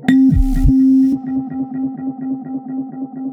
Instrument samples > Synths / Electronic
CVLT BASS 7
lowend, synthbass, wobble, subbass, low, lfo, drops, synth, subwoofer, bassdrop, bass, stabs, wavetable, subs, sub, clear